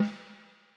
Music > Solo percussion
Snare Processed - Oneshot 143 - 14 by 6.5 inch Brass Ludwig
drums, flam, fx, kit, perc, rim